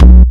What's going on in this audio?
Instrument samples > Percussion
🪘 🥁 It's less clicky with extreme music. It's truly good with music. Remind me to use it! The distortions aren't audible in extreme music. With WaveLab 11 restoration you can totally unclick all files, but you have to re-attach the original attack. I compose extreme music thus I have many overboosted files. tags: overboosted bass sangban kenkeni bubinga death death-metal drum drumset DW floor floortom ngoma heavy heavy-metal metal ashiko rock sapele bougarabou Tama thrash thrash-metal tom tom-tom unsnared Africa African dundun dundunba